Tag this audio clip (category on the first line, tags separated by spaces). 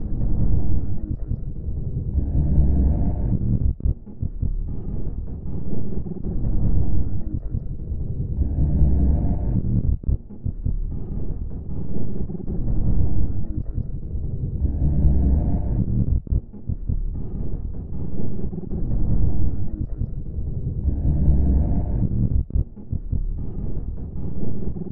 Soundscapes > Synthetic / Artificial
Alien Ambient Dark Drum Industrial Loop Loopable Packs Samples Soundtrack Underground Weird